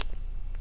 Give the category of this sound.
Sound effects > Other mechanisms, engines, machines